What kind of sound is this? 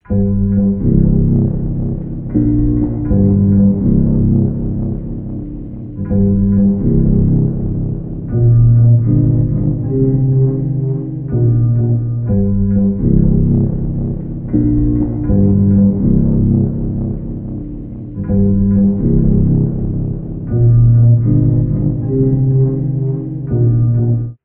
Music > Solo instrument
Deep ambient bass loop 160bpm
Made in FL Studio with Analog Labs V, Used effects such as Cymatics Deja Vu and Fruity delay 3. Daw Bpm is 320, but it sounds slower, so I'd say 160. Use for anything :)
ambient, piano, low, bass, deep, stereorized, dark, deepambient, synth